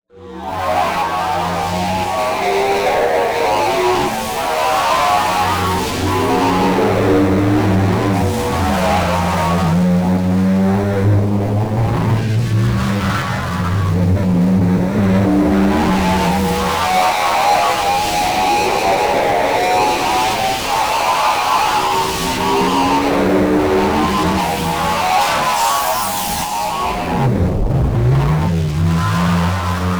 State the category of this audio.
Soundscapes > Synthetic / Artificial